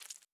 Sound effects > Electronic / Design
Flesh/Gibbet Hit Sound
While this sound isn't much on its own, it's very useful for contexts where you want to hear wet and fleshy noises when an organic enemy takes damage. Eg., when machine gun fire rains down upon a flesh golem or when bits of zombies rocked by an explosion collide with nearby surfaces.